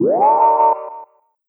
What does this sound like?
Sound effects > Electronic / Design
Vintage Power Up
A simple lil sound effect made to resemble something 'retro' starting up. Works for many applications. Made on a Korg Minilogue XD
ignition, sfx, start-up, start, Power-On, ui, Generator, sound-effect, power, Operation